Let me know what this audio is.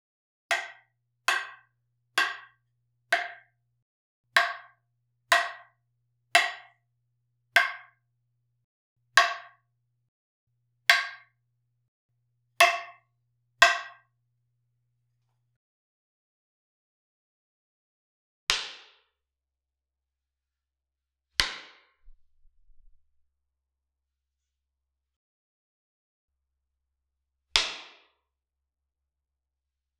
Sound effects > Objects / House appliances
wooden rollers Stick being hit w long wooden ruler and wooden cane 04112025
raw recordings of roller stick being hit with a long ruler and a wooden cane.
foley, stick, punch, hit, melee, fighting, wooden, TMNT, combat, kung-fu, martialarts, wood, staff, fighter, duel, weapon, karate, escrima, attack, weaponry, battle, ninjutsu, fight